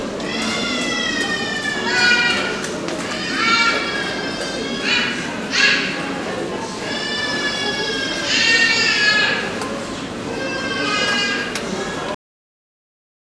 Soundscapes > Indoors
Children shouting in a supermarket
A very young child cries and screams, and his big sister echoes him.